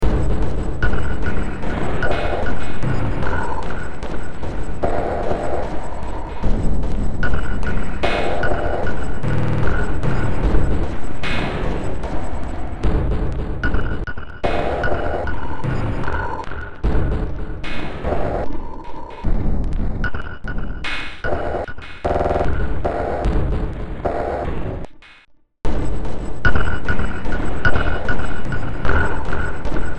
Music > Multiple instruments
Demo Track #3964 (Industraumatic)
Ambient, Cyberpunk, Games, Horror, Industrial, Noise, Sci-fi, Soundtrack, Underground